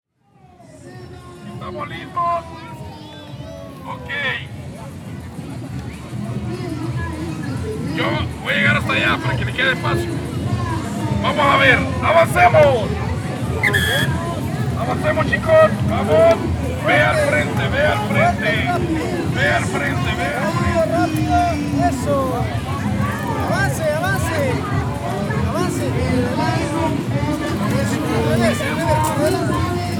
Speech > Solo speech
instructor musical arengando - El Salvador
Vocal sound of a music teacher instructing kids with a megaphone. Record in El Cafetalon park, Santa Tecla. Captured by Fafa Cordova during a music rehearsal.